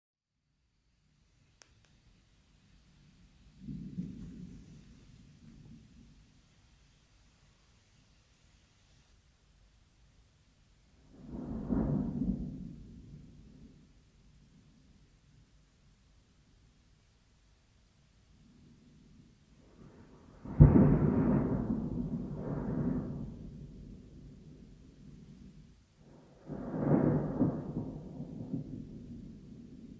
Sound effects > Natural elements and explosions
Thunder at night 01
nature, thunder-storm, storm, lightning, weather, rain, thunder, thunderstorm